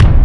Instrument samples > Percussion
taiko tom 4
bass, powerful, drums, death-metal, mainkick, basskick, heavy, China, strong, tom, beat, taikoid, cylindrical, pop, metal, tom-tom, percussion, dance, drum, unsnared, rock, floor-1, Asia, kick, taiko, Japan, wadaiko